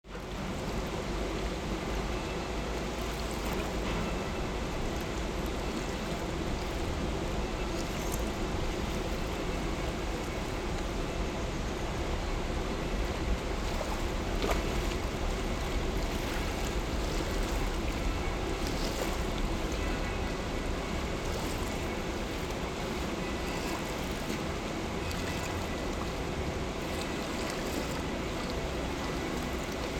Soundscapes > Nature
Ambisonics Field Recording converted to Binaural. Information about Microphone and Recording Location in the title.